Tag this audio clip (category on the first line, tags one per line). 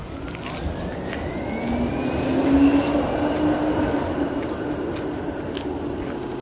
Sound effects > Vehicles
field-recording
Tampere
tram